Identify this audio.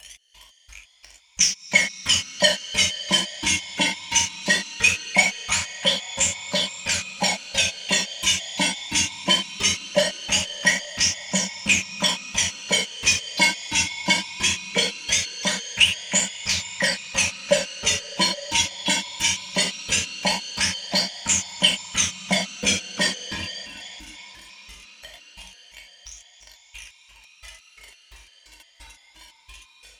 Music > Solo percussion
Simple Bass Drum and Snare Pattern with Weirdness Added 027
Bass-and-Snare, Bass-Drum, Experimental, Experimental-Production, Experiments-on-Drum-Beats, Experiments-on-Drum-Patterns, Four-Over-Four-Pattern, Fun, FX-Drum, FX-Drum-Pattern, FX-Drums, FX-Laden, FX-Laden-Simple-Drum-Pattern, Glitchy, Interesting-Results, Noisy, Silly, Simple-Drum-Pattern, Snare-Drum